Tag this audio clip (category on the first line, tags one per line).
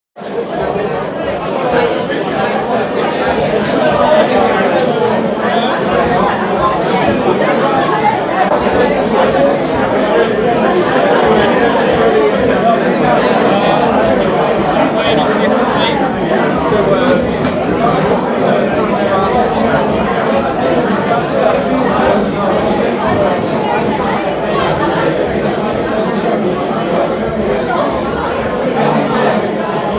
Soundscapes > Indoors
background
people
bar
pub
restaurant
atmos
crowd
ambience
club
chatter
burble